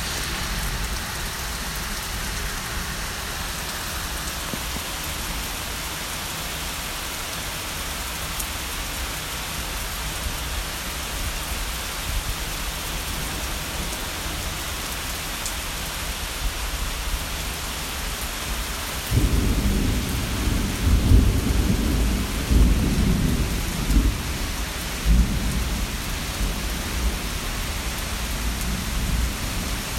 Soundscapes > Nature
Rain with distant thunder
lightning, rain, storm, thunder, weather